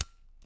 Sound effects > Other mechanisms, engines, machines
shop foley-039
bam, bang, boom, bop, crackle, foley, fx, knock, little, metal, oneshot, perc, percussion, pop, rustle, sfx, shop, sound, strike, thud, tink, tools, wood